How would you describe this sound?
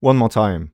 Speech > Solo speech
One more time 2
more, U67, one, Man, time, raw, un-edited, voice, Vocal, oneshot, Tascam, dry, hype, chant, Male, Single-take, Neumann